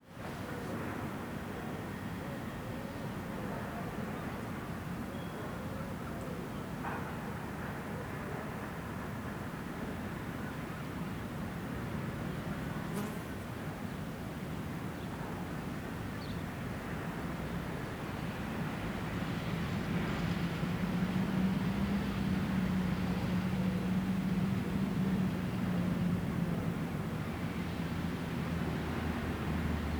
Soundscapes > Urban
Splott - Park Distant Industry Pigeons Seagulls Bugs Distant Traffic Beeps - Splott Park
fieldrecording, splott